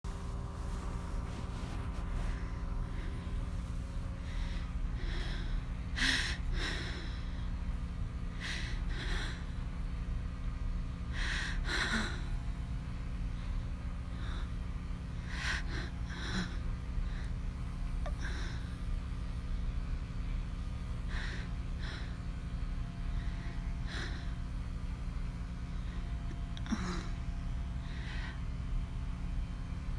Sound effects > Human sounds and actions
Hush Rachel Orgasm
At first, it’s just my breath — shallow, uneven, slipping out in quiet gasps. My body responds faster than I expect. A shiver runs through me, and I feel my nipples tighten, hard and aching, brushed by the air and hyperaware of everything. The vibrator hums steadily between my legs, subtle but relentless. My thighs twitch. My hips tilt without thinking. A soft moan escapes — low at first, then rising as sensation builds. I can hear myself, the sound sharp and breathy, spilling out without control. The pressure grows, and so do the sounds — moans that stretch longer, broken by short, high cries as my body begins to pulse and tighten. My breathing is ragged now, chest heaving, nipples stiff and sensitive, every inch of me on edge. And then I’m gone. My back arches, the orgasm tearing through me, and the sound that comes out is raw — one long, unfiltered moan that trembles at the edges.